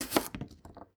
Sound effects > Other
Potato being cut with a santoku knife in a small kitchen.